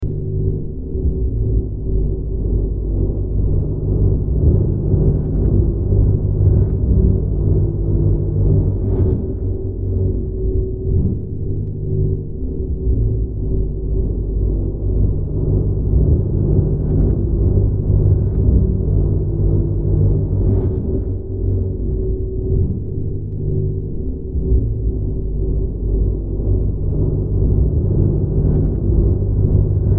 Soundscapes > Synthetic / Artificial
Looppelganger #170 | Dark Ambient Sound
Weird, Survival, Gothic, Ambience, Horror, Ambient, Games, Drone, Sci-fi, Soundtrack, Underground, Hill, Noise, Silent, Darkness